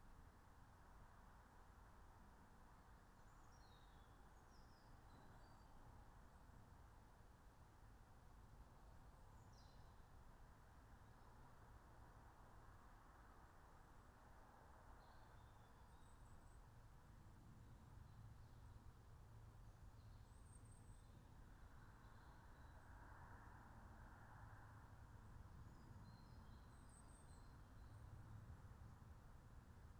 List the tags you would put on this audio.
Soundscapes > Nature
nature alice-holt-forest

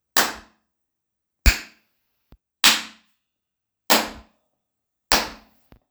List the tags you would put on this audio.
Instrument samples > Percussion
drums percussion hands percussive clap drum hit clapping hand claps

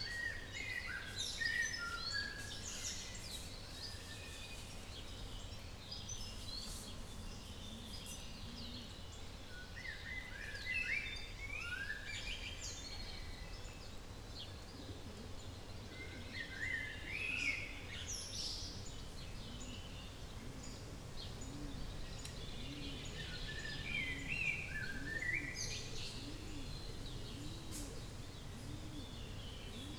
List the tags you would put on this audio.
Soundscapes > Nature
birds countryside rural

